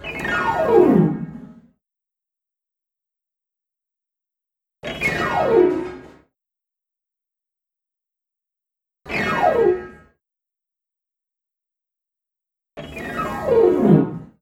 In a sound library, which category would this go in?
Music > Solo instrument